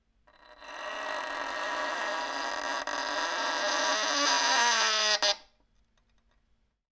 Instrument samples > String
Bowing broken violin string 4
bow, horror, violin, broken, beatup, creepy, uncomfortable, strings, unsettling